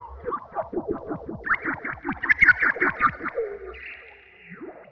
Soundscapes > Synthetic / Artificial
LFO Birdsong 70
Description on master trackDescription on master track
Birdsong, LFO, massive